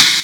Instrument samples > Percussion
spock 18 inches Zildjian Soundlab Medium Thin Prototype - 19 inches Z3 China short
Slightly low-pitched part of my Zildjian China and spock crashes in various lengths (see my crash folder). tags: spock Avedis bang China clang clash crack crash crunch cymbal Istanbul low-pitchedmetal Meinl metallic multi-China multicrash Paiste polycrash Sabian shimmer sinocrash Sinocrash sinocymbal Sinocymbal smash Soultone Stagg Zildjian Zultan
crash, Sabian